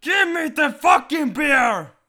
Speech > Solo speech
give me the fucking beer
angry, bar, beer, human, male